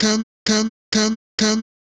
Speech > Solo speech
BrazilFunk Vocal Chop One-shot 21 130bpm
Vocal, BrazilFunk, One-shot, FX